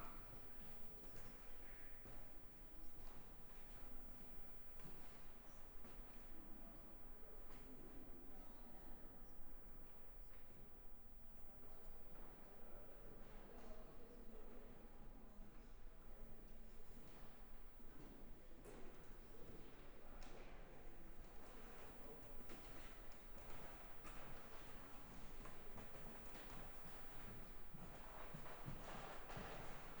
Soundscapes > Indoors
AMBPubl People viewing sculptures at an art gallery with creaking boards, Ronneby, Sweden
Recorded 14:56 04/05/25 Inside the Ronneby culture center, there’s a touring Swedish sculptors' installation which is placed in the largest hall. People take informational papers and walk around and converse while observing the works. There’s plenty of creaking from the wooden floorboards and reverb in the hall. Zoom H5 recorder, track length cut otherwise unedited.
Art, Boards, Creaking, Culture, Echo, Field-Recording, Floor, Gallery, Hall, Murmur, Papers, People, Reverb, Ronneby, Sweden, Swedish, Talking, Walking, Wooden